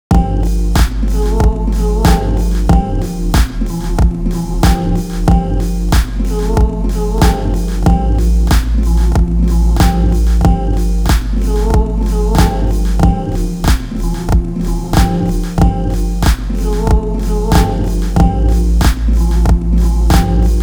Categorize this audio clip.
Music > Multiple instruments